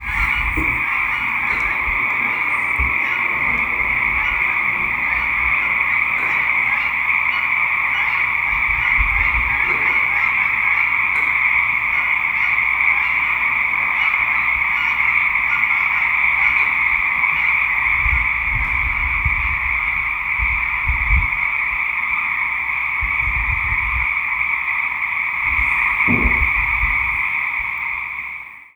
Soundscapes > Nature
Frogs around Warehouse with Geese Migrating Above
Nature recording in the redwoods using Tascam dr-05 field recorder